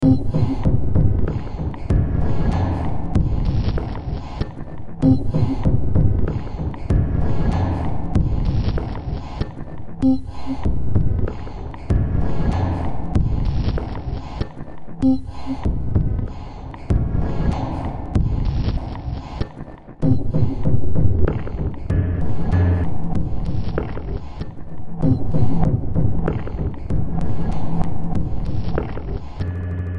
Music > Multiple instruments
Demo Track #3616 (Industraumatic)
Industrial, Underground